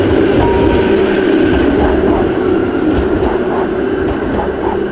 Sound effects > Vehicles
Tram00043393TramPassing
field-recording, tram, transportation, vehicle